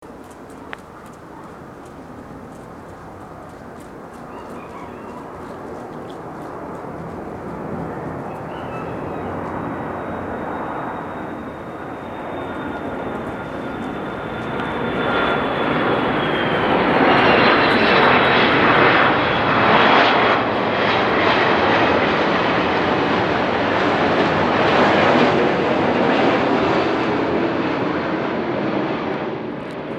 Sound effects > Other mechanisms, engines, machines
Boeing 737-223(F), UsaJet Airlines flyby, 150Mts close, last 2.77 miles for land procedure. Recorded with SONY IC Recorder. Mod. ICD-UX560F